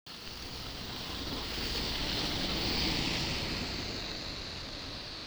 Sound effects > Vehicles
tampere bus6
bus, transportation, vehicle